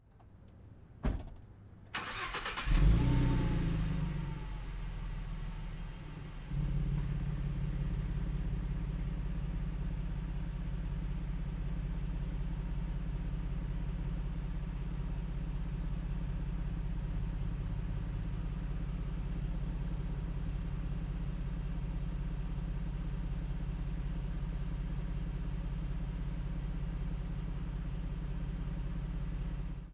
Sound effects > Vehicles
Van Engine Start then Idle
Door close, engine start, then idle of 2018 Ford Transit 11 Passenger Van (US). Recorded with Zoom H1e using onboard mics sitting on short tripod near the vehicle.